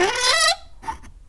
Sound effects > Other mechanisms, engines, machines
metal shop foley -101
bam, bang, boom, bop, crackle, foley, fx, knock, little, metal, oneshot, perc, percussion, pop, rustle, sfx, shop, sound, strike, thud, tink, tools, wood